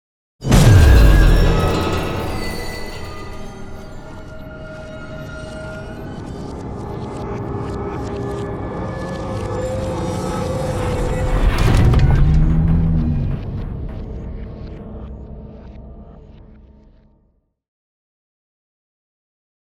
Sound effects > Other
Effects recorded from the field.